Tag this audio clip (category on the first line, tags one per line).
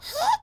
Sound effects > Human sounds and actions
Blue-Snowball
hiccup
cartoon
baby
Blue-brand